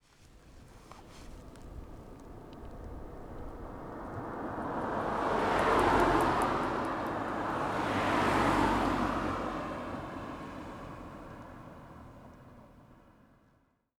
Soundscapes > Nature
Two cars passing by on a street, captured from roadside perspective.
cars, driving, passing, road, street, traffic, transport, vehicles